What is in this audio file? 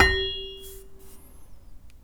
Sound effects > Other mechanisms, engines, machines
metal shop foley -038
bang, oneshot, tink, bam, boom, rustle, bop, wood, tools, fx, thud, percussion, knock, sound, sfx, pop, metal, little, shop, foley, perc